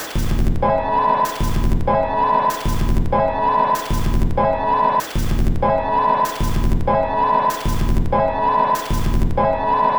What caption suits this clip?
Instrument samples > Percussion
This 192bpm Drum Loop is good for composing Industrial/Electronic/Ambient songs or using as soundtrack to a sci-fi/suspense/horror indie game or short film.
Loop, Drum, Soundtrack, Dark, Samples, Ambient, Loopable, Alien, Packs, Industrial, Weird, Underground